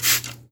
Sound effects > Objects / House appliances
A spray bottle.